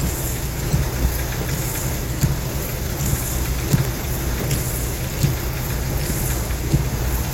Sound effects > Vehicles
VEHMech-Samsung Galaxy Smartphone, CU Windshield Wipers, Exterior Nicholas Judy TDC
Windshield wipers. Exterior perspective.
Phone-recording, exterior, windshield-wipers